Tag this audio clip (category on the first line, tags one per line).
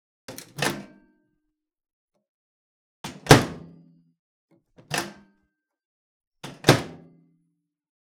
Sound effects > Objects / House appliances
CCO
close
closing
door
doors
foley
impact
kitchen
microwave
open
opening
shut
slam